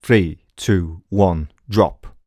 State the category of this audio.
Speech > Solo speech